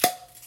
Sound effects > Other
just the sound for like a cork or something